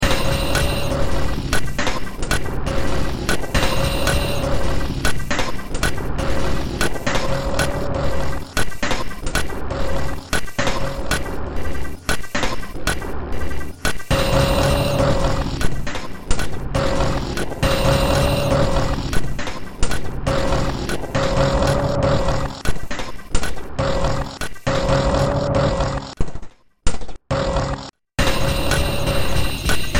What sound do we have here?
Music > Multiple instruments
Short Track #3790 (Industraumatic)
Cyberpunk, Underground, Noise, Sci-fi, Ambient, Industrial, Soundtrack, Games, Horror